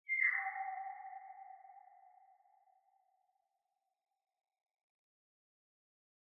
Sound effects > Electronic / Design
Gloaming Hawk Cry 1
It is said to only vocalize at sunset and uses its magic to stay invisible most of the time. Beyond that, virtually nothing is known about this enigmatic creature.